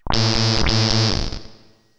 Instrument samples > Synths / Electronic
Benjolon 1 shot27
MODULAR, 1SHOT, CHIRP, DRUM, NOISE, SYNTH